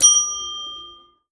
Sound effects > Objects / House appliances
BELLHand-Samsung Galaxy Smartphone, CU Desk, Ring 04 Nicholas Judy TDC
A desk bell ringing. Recorded at Tractor Supply.
bell, desk, Phone-recording, ring